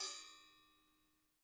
Music > Solo instrument
Paiste Custom 22inch Ride rhythm-003
Recording from my studio with a custom Sonor Force 3007 Kit, toms, kick and Cymbals in this pack. Recorded with Tascam D-05 and Process with Reaper and Izotope